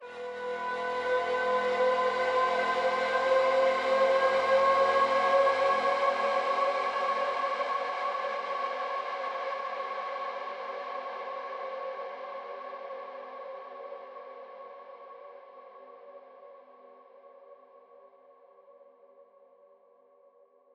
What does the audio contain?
Sound effects > Electronic / Design
WARNING ONE
The first haunting drone in a series of two that was created with a synth and processed with various plugins. Use this one to add "haunt" to your piece.
sfx, design, effects, sounddesign, haunting, fx, sound-design, drone, sound-effects, sound